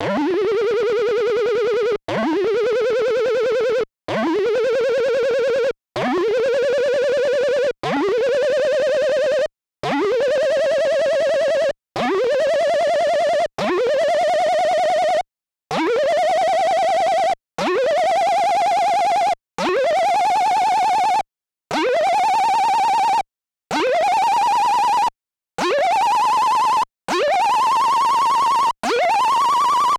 Soundscapes > Synthetic / Artificial
232 Synth Week 6 PC Lead Vaccum
Lead, Synth